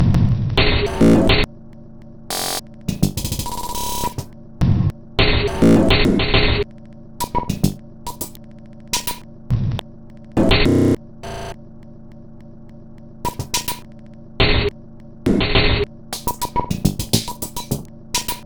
Instrument samples > Percussion
This 104bpm Drum Loop is good for composing Industrial/Electronic/Ambient songs or using as soundtrack to a sci-fi/suspense/horror indie game or short film.
Alien, Ambient, Dark, Industrial, Loop, Loopable, Packs, Samples, Soundtrack, Underground, Weird